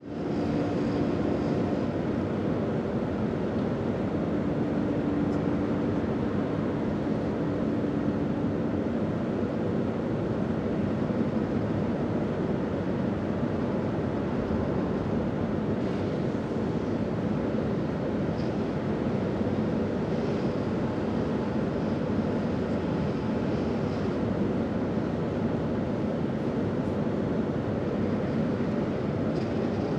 Soundscapes > Urban
Saint Nazaire industrial harbour cranes 1
cranes, docks, engine, field-recording, harbour, industrial, machine, Montoir-de-Bretagne, Saint-Nazaire, soybeans